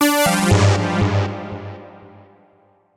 Instrument samples > Synths / Electronic
Denied/Wrong (Jingle)
The 1st Version Of This Jingle, Could Be Used To Show Something Is Bad.
computer, futuristic, scifi